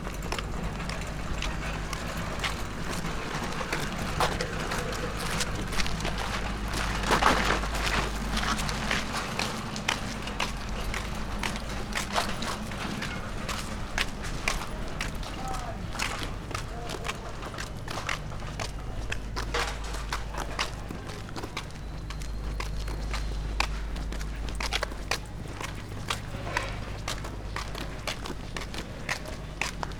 Soundscapes > Urban
20251024 GranVia Walking Nature Cars Energetic
Walking, Energetic, Cars, Nature